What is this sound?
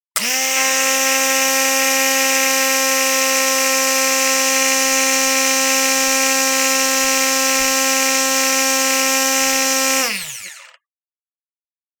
Sound effects > Objects / House appliances
blender motor mode 1
A blender spinning at the speed 1. Recorded with Zoom H6 and SGH-6 Shotgun mic capsule.
blender, blending, kitchen, motor